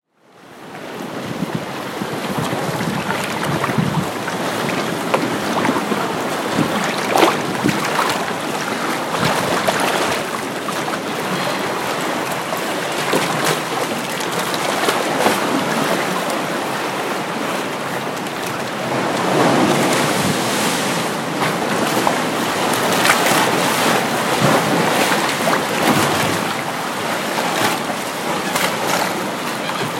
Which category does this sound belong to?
Soundscapes > Nature